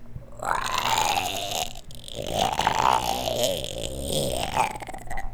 Sound effects > Animals
vocal zombie growl 2